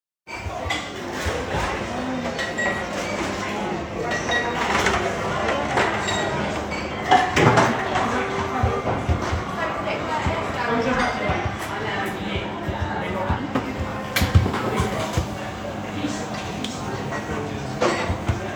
Soundscapes > Indoors
Sounds of a Busy Cafe
A busy cafe recorded on 22nd August, 2025 in Yeovil, Somerset, UK. Recorded with a Google Pixel 9a. I want to share them with you here.
food, Restaurant, town, talking, Busy